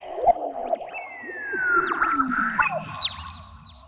Soundscapes > Synthetic / Artificial
LFO Birdsong 55
Description in master track
Birdsong, LFO, massive